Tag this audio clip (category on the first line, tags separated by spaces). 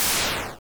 Sound effects > Electronic / Design
dead death explosion game games hit kill killed kills power rpg videogame